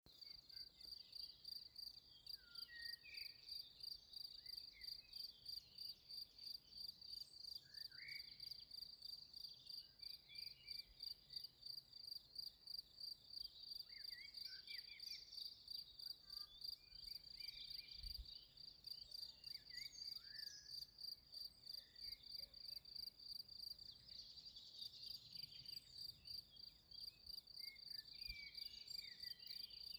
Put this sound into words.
Soundscapes > Nature
Forest clearing during spring #2 with crickets in the foreground + birds in the bg
#0:58 plane overhead